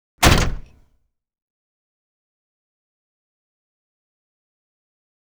Sound effects > Other mechanisms, engines, machines
custom transformers one inspired megatron heavy mechanical metal impact sound. this sound was inspired during D16's transformation into Megatron. speed -44.000.)